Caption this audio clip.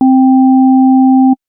Instrument samples > Synths / Electronic
04. FM-X ODD1 SKIRT0 C3root
FM-X, MODX, Montage, Yamaha